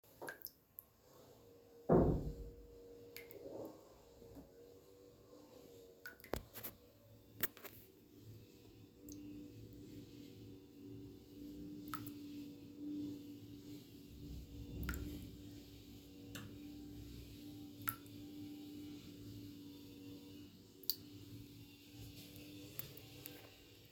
Sound effects > Other
Water drops
23s water dripping sound
dripping, water, drop